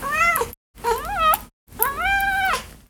Sound effects > Animals
My cat was hungry and I recorded him angry, you can hear the jingle of his collar a little.